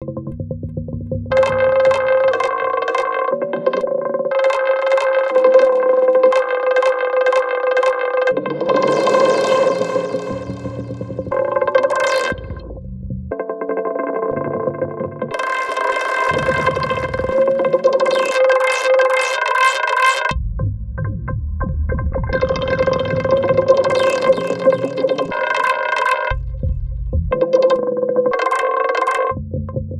Instrument samples > Synths / Electronic
Texture out of FM modulation of ping-pong ball hitting the table